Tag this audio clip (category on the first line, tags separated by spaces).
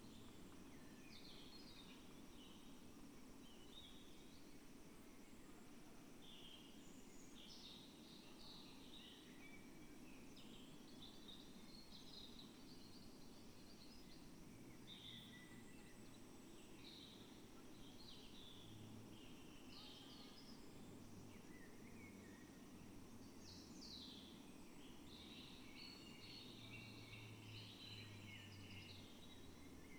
Soundscapes > Nature
Dendrophone artistic-intervention natural-soundscape phenological-recording data-to-sound modified-soundscape soundscape field-recording alice-holt-forest weather-data nature sound-installation raspberry-pi